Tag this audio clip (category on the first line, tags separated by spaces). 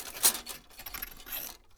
Sound effects > Other mechanisms, engines, machines
metal bang bam tink strike shop sfx oneshot perc rustle little thud bop crackle fx wood percussion foley sound tools boom knock pop